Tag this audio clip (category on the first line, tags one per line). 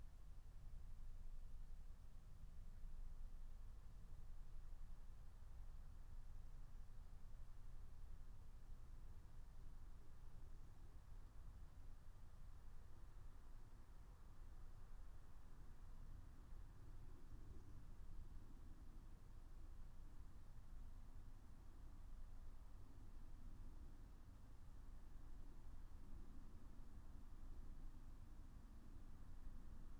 Soundscapes > Nature
phenological-recording
alice-holt-forest
natural-soundscape
nature
soundscape
meadow
field-recording
raspberry-pi